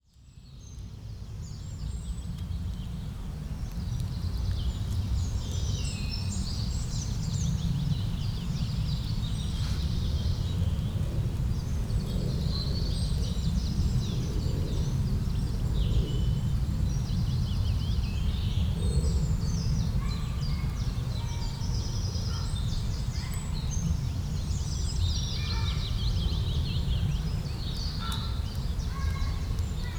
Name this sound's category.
Soundscapes > Nature